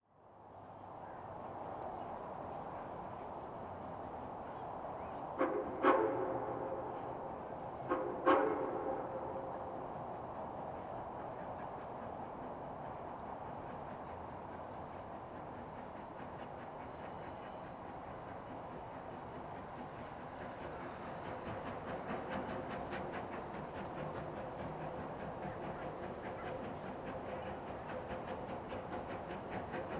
Sound effects > Other mechanisms, engines, machines
A historic steam train chugs by slowly pulling some holiday tourists. A couple of short toots of the whistle as it heads off into the distance.
train, railroad, steam-train, locomotive, steam, historic